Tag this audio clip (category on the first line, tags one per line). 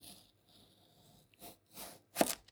Sound effects > Other
Knife
Cook
Home
Chef
Chief
Kitchen
Cooking
Indoor
Vegetable
Cut
Slice